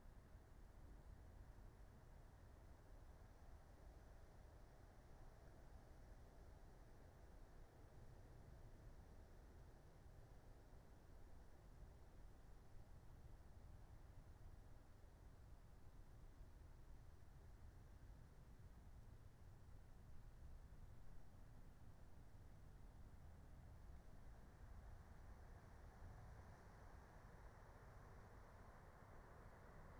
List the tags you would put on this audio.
Soundscapes > Nature
natural-soundscape
phenological-recording
meadow
alice-holt-forest
raspberry-pi
field-recording
soundscape
nature

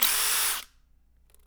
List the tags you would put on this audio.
Sound effects > Objects / House appliances
industrial
oneshot
foley
sfx
fx
drill
mechanical
foundobject
percussion
natural
perc
object
stab
fieldrecording
bonk
metal
hit
clunk
glass

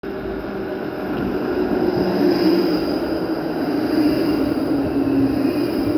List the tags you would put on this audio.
Soundscapes > Urban
rail
tram
tramway

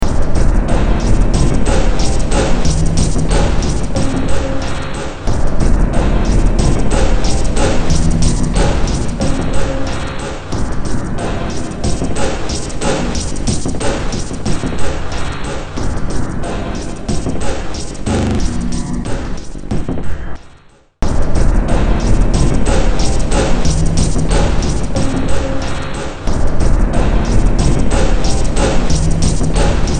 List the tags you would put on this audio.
Music > Multiple instruments
Horror
Noise
Sci-fi
Soundtrack
Underground
Industrial
Cyberpunk
Ambient
Games

